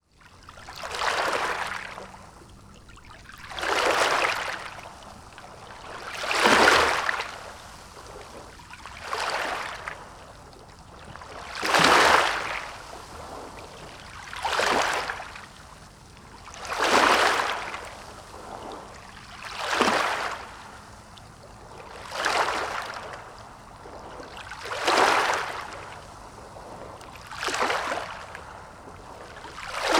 Soundscapes > Nature
The recorder was on a mini tripod right next to where the waves/wash were landing. This beach is opposite the port of Southampton, so there is a constant low rumble in the background from all the goings on over there. Zoom H5 Studio, on board XY mics, deadcat.